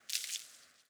Sound effects > Natural elements and explosions
Hitting a dry bush with a stick recorded with a Rode NTG-3 making the leaves rustle.